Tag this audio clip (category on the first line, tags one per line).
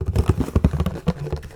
Sound effects > Objects / House appliances
clang
clatter
container
garden
kitchen
lid
pour
spill